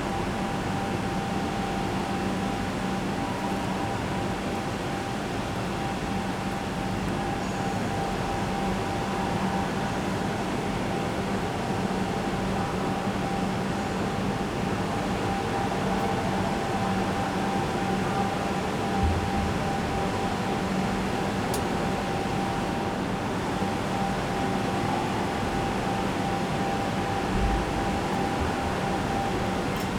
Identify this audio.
Soundscapes > Urban
Recording the moment of waiting the train when you had bought the train ticket we record the zone of the train tracks